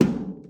Instrument samples > Percussion
drum-001 hi tom
My sister's hi tom with damper rubber ring. And I've removed the reverb. This audio still has a slight sense of space, possibly due to the recording distance.